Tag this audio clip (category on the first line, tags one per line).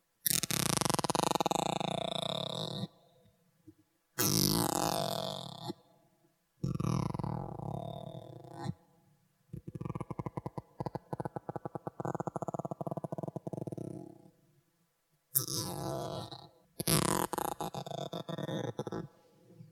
Sound effects > Objects / House appliances
sample
techno